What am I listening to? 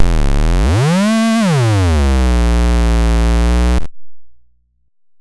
Sound effects > Electronic / Design
Optical Theremin 6 Osc dry-006
Digital, DIY, Dub, Electronic, Experimental, FX, Glitch, Infiltrator, Instrument, Noise, noisey, Optical, Robot, Robotic, Sci-fi, Scifi, Spacey, Theremins